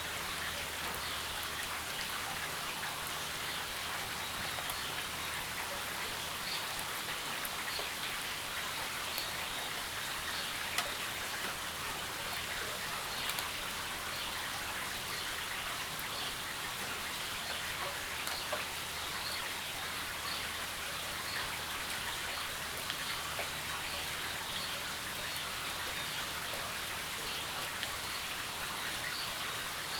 Soundscapes > Urban
2025 04 22 13h07 Gergueil Rain XY
Subject : Garden ambience of rain in Gergueil. Date YMD : 2025 04 22 13h07 Location : Gergueil France. Hardware : Zoom H2n XY mode. Weather : Raining, little to no wind. Processing : Trimmed and Normalized in Audacity.
Zoom, outdoor, Xy, April, raining, cote-dor, Rain, H2n, Gergueil, 2025, ambience